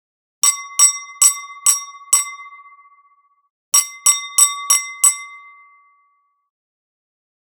Sound effects > Objects / House appliances
knife-tapping-wine-glass
A metal knife tapping a wine glass. Recorded with Zoom H6 and SGH-6 Shotgun mic capsule.